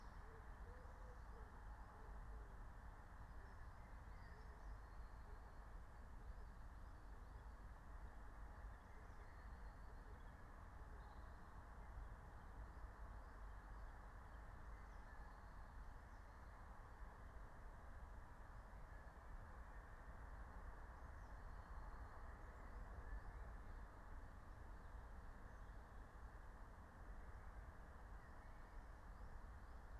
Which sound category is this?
Soundscapes > Nature